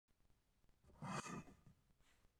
Sound effects > Objects / House appliances
Chess piece sliding 2
A pawn sliding one place across a wooden chessboard.
hiss, chess, wooden, slide, swish, object, fabric